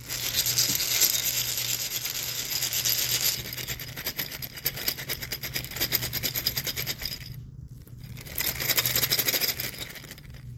Sound effects > Objects / House appliances
Chess pieces rattling.